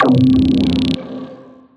Instrument samples > Synths / Electronic
CVLT BASS 72
synth, wobble, lowend, synthbass, wavetable, bassdrop, sub, low, subwoofer, lfo, drops, stabs, bass, clear, subbass, subs